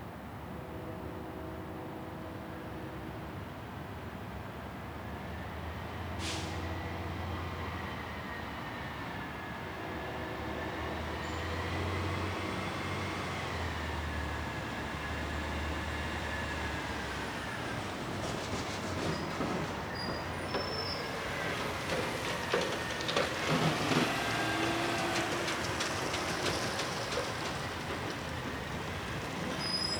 Soundscapes > Urban
A garbage truck collecting garbage in my neighborhood. Various bangs and compressors and idling engine.